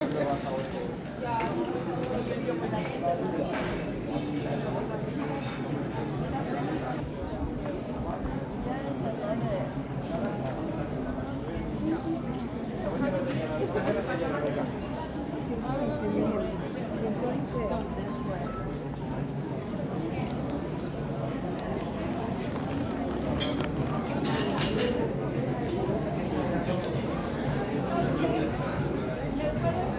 Soundscapes > Urban
Salamanca 26 May 2024 ext cafes and streets 02
Sounds of diners at outdoor cafes talking; sounds include dishes clattering. Recording device: Samsung smartphone.
diners, outdoor-cafe, Salamanca